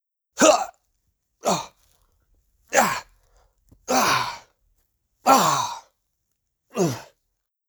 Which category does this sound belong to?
Speech > Other